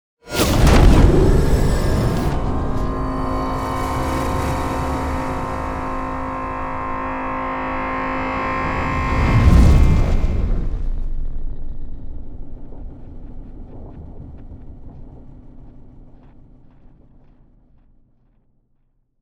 Sound effects > Other
Sound Design Elements SFX PS 083
implosion, sweep, indent, tension, impact, game, effect, deep, sub, industrial, riser, video, explosion, movement, epic, cinematic, hit, transition, metal, boom, reveal, whoosh, trailer, bass, stinger